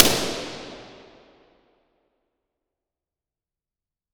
Soundscapes > Other
I&R Esperaza's church - Altar side - OKM1
Subject : An Impulse and response (not just the response.) of Esperaza's church. Popping a balloon on the altar side of the church. Date YMD : 2025 July 12 Daytime Location : Espéraza 11260 Aude France. Recorded with a Soundman OKM1 Weather : Processing : Trimmed in Audacity. Notes : Recorded with both a Superlux ECM 999 and a Soundman OKM-1 Tips : More info in the metadata, such as room size, height of pop and mic.
11260, ballon, balloon, Church, convolution, Convolution-reverb, Esperaza, FR-AV2, FRAV2, Impulse, Impulseandresponse, IR, OKM1, omni, pop, Response, Reverb, Soundman, Tascam